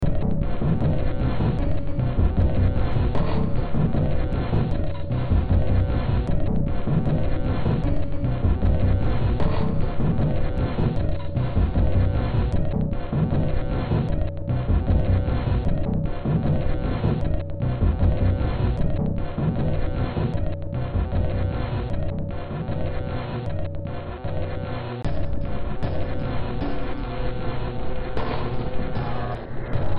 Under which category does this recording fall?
Music > Multiple instruments